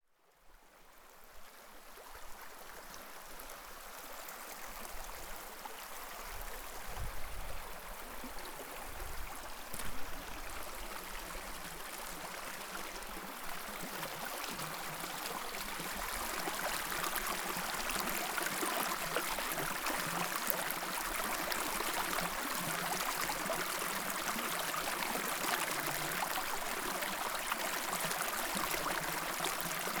Soundscapes > Nature
Fern Canyon Babbling Brook in the Redwoods 2
A peaceful brook stream in the Redwoods National Park , Fern Canyon , California recorded on a Tascam D-05 field recorder
Stream, rushing, Brook, Natural, River, drip, Water, slosh, Nature, splash, Environment, Redwood, Roomtone, Redwoods, Canyon, Ambience